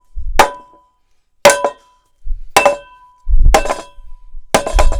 Sound effects > Objects / House appliances
Clang audio
I banged on metal for bit to get different sounds sorry if there's any problems